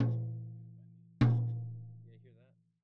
Music > Solo percussion
Med-low Tom - Oneshot 46 12 inch Sonor Force 3007 Maple Rack
acoustic, beat, drum, drumkit, drums, flam, kit, loop, maple, Medium-Tom, med-tom, oneshot, perc, percussion, quality, real, realdrum, recording, roll, Tom, tomdrum, toms, wood